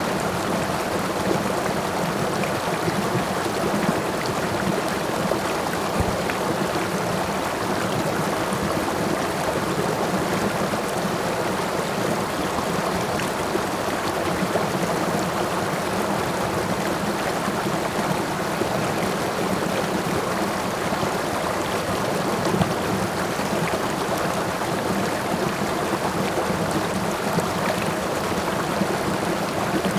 Soundscapes > Nature
River layers 03
Layers of river and waterfall sounds at the Loup of Fintry. Recorded in ORTF using Line audio CM4's.
casade, field-recording, nature, river, water, waterfall